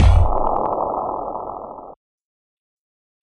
Sound effects > Electronic / Design
Impact Percs with Bass and fx-047
bash, bass, brooding, cinamatic, combination, crunch, deep, explode, explosion, foreboding, fx, hit, impact, looming, low, mulit, ominous, oneshot, perc, percussion, sfx, smash, theatrical